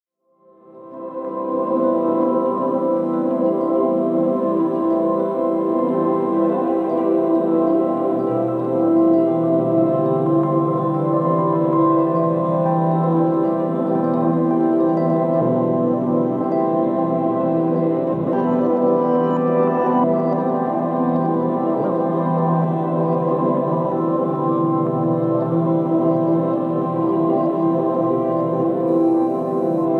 Music > Other
New things are happening all the time and I usually miss them.
ambient, dreamy, glitch, rhodes, tape, lofi, pad, drone